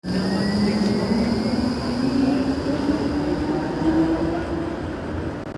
Soundscapes > Urban
Sound of tram moving near a stop in Tampere. Recorded with Apple iPhone 15.
streetcar, tram, transport